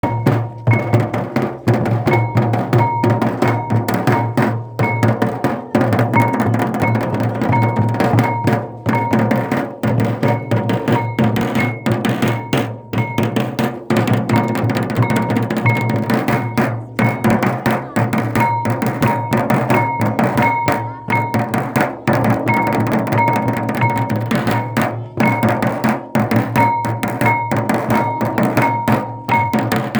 Music > Multiple instruments
Santhal dance
This sound is of music played while Santhal Adivasi women dance. The recording was made in the Adivasi region of Jungal Mahal in West Bengal's Bankura district. It is a dance performed after sunset. Preferably night. The women sway to the pulsating beat of the Santhal drums, like the "madol".
adivasi, adivasidancemusic, Bankura, dance, madol, music, santhal